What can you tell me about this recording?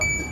Sound effects > Electronic / Design
BEEP-Samsung Galaxy Smartphone, CU Long Nicholas Judy TDC
A long beep. Recorded at The Home Depot.
beep, electronic, long, Phone-recording